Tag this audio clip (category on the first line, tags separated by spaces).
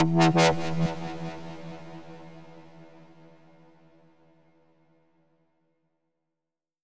Instrument samples > Synths / Electronic
T-Force-Alpha-Plus AUDACITY FLSTUDIO